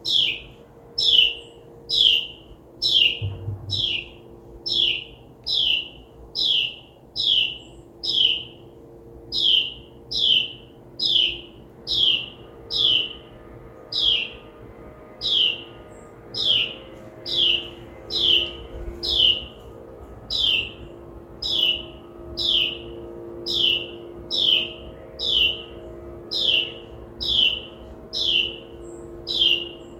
Sound effects > Animals
BIRDSong-Samsung Galaxy Smartphone, CU Carolina Wren, Chirping Nicholas Judy TDC
A carolina wren chirping.